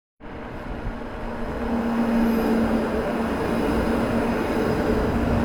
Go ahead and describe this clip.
Sound effects > Vehicles
A Tram passes by
Tram, Passing